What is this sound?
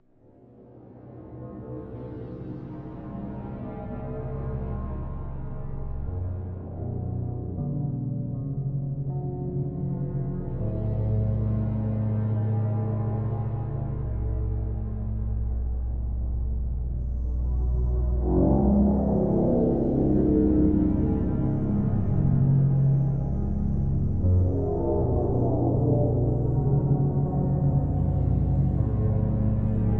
Soundscapes > Synthetic / Artificial
ambient industrial drone